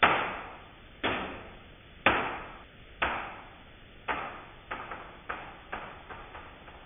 Sound effects > Other
Slowed down audio of a small plastic bit bouncing on wooden parquet. Recorded with my phone.
collide, impact, hit, bounce, thud, scary, plastic